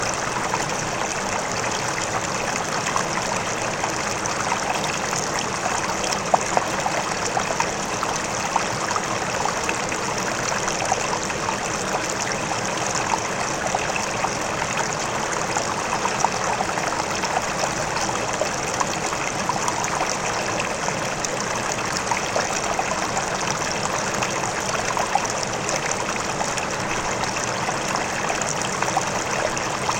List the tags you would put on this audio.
Soundscapes > Nature
Bubbles
Splash
Water

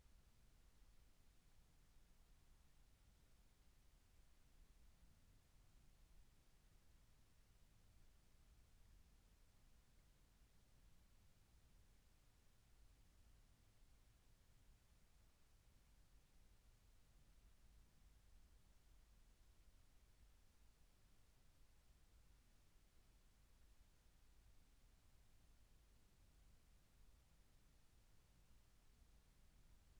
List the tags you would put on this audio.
Soundscapes > Nature
sound-installation
weather-data
natural-soundscape
nature
artistic-intervention
modified-soundscape
Dendrophone
alice-holt-forest
field-recording
soundscape
raspberry-pi
phenological-recording
data-to-sound

